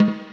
Music > Solo percussion
Snare Processed - Oneshot 72 - 14 by 6.5 inch Brass Ludwig
ludwig, kit, percussion, processed, rimshots, brass, realdrums, drums, snareroll, hit, drum, fx, drumkit, oneshot, flam, hits, snaredrum, snares, acoustic, reverb, realdrum, perc, crack, snare, rim, rimshot, sfx, beat, roll